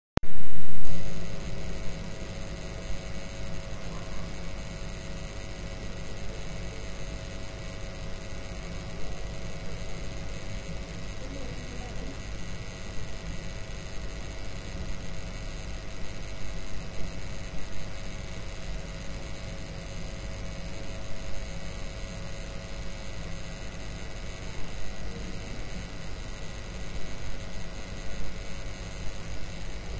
Sound effects > Objects / House appliances
electric static buzzing
My speaker is buzzing monotonously because of slight disconnection. Recorded with Redmi 9C.